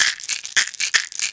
Sound effects > Objects / House appliances
Pill Bottle Shake 6
Pill sound effects
bottle, doctors, drugs, lab, meds, Pill, pills, shaking